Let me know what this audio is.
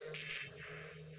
Soundscapes > Synthetic / Artificial
LFO Birsdsong 8
massive, Birsdsong, LFO